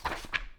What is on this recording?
Sound effects > Objects / House appliances
Paper Page Flip
Paper page of a large book (fitting for a storybook tutorial/transition) recorded by me on my RØDE microphone with a sketchbook for a game jam :) thought it came out nice and others may like to use it
flick, flip, newspaper, paper, turn